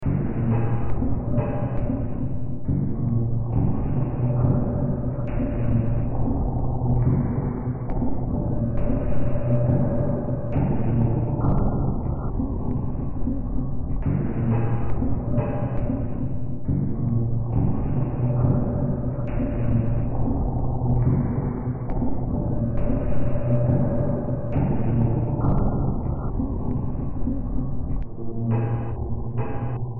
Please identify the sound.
Music > Multiple instruments
Demo Track #3514 (Industraumatic)
Ambient, Cyberpunk, Games, Horror, Industrial, Noise, Sci-fi, Soundtrack, Underground